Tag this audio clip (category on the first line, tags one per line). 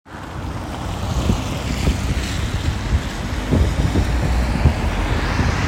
Sound effects > Vehicles
outside
vehicle
car
automobile